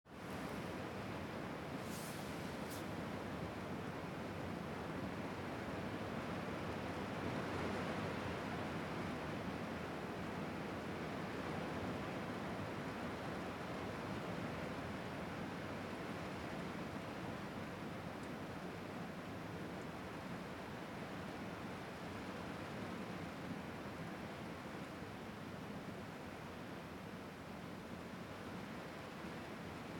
Soundscapes > Indoors
Raining-hard-on-roof-from-inside
This was recorded on my last floor appartment, and it was raining hard with wind just above my head. For ambiant use in the background.
indoors, roof, cozy, wind, storm, raindrops, windy, rain, raining